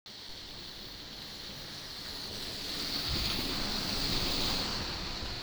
Sound effects > Vehicles
tampere bus10
bus, transportation, vehicle